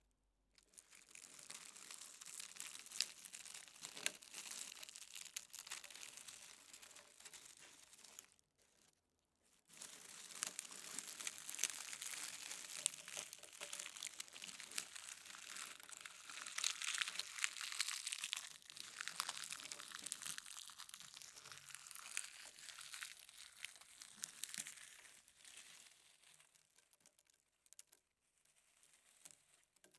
Sound effects > Natural elements and explosions
leavvves Recorded with zoom H2n, edited with RX
leaves, autumn, effect, forest